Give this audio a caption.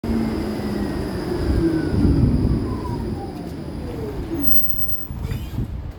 Sound effects > Vehicles
02.Tram tostop hervanta28.11
A tram is slowing down to a stop. You can hear the movement of the tram, the slowing of the speed, and the squeaking of the breaks at the end. Recorded with a Samsung phone.
slowing, tram, stop